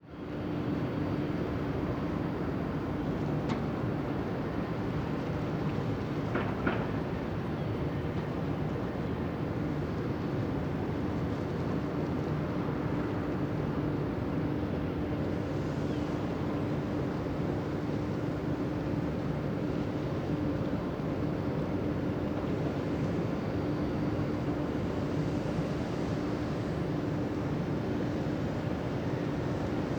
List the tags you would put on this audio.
Soundscapes > Urban
general-noise harbour soundscape urban field-recording ambiance atmosphere roof-top Saint-Nazaire ambience city

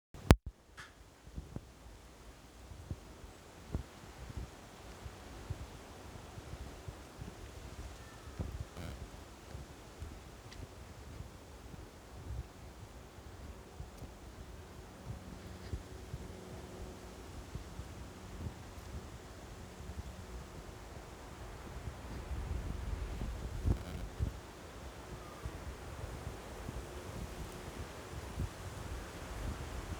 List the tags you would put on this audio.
Soundscapes > Nature
Soundscape Wind Mildura

